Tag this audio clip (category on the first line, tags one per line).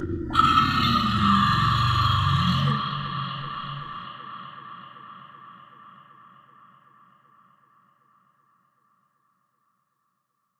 Sound effects > Experimental
Creature
demon
Otherworldly
Snarl
Reverberating
fx